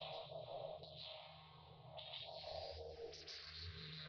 Soundscapes > Synthetic / Artificial
LFO Birdsong 7
massive, LFO